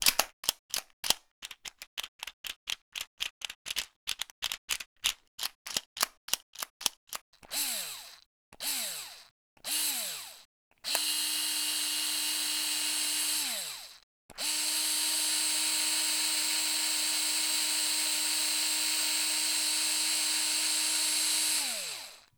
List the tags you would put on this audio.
Sound effects > Objects / House appliances
drill electric-tool

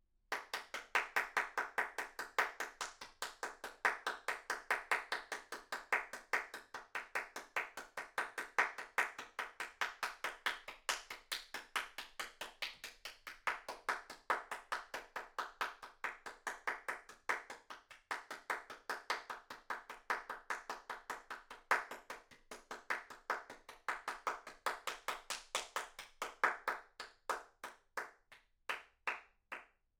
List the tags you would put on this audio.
Sound effects > Human sounds and actions
clap Rode solo Applaud AV2 person indoor FR-AV2 XY NT5 Solo-crowd Applause Applauding clapping Tascam individual

